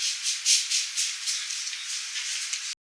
Sound effects > Electronic / Design
Samples recorded during my time as a cashier summer 2017 newly mixed and mastered for all your audio needs. This is a sound that can be used as a downshifter in electronic music to decrease tension when transitioning sections of the song.